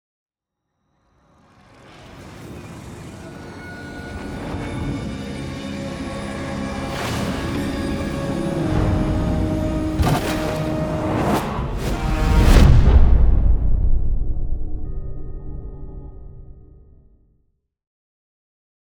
Sound effects > Other
Sound Design Elements SFX PS 082
bass, boom, cinematic, deep, effect, epic, game, hit, impact, indent, industrial, metal, movement, reveal, riser, stinger, sweep, trailer, transition, video, whoosh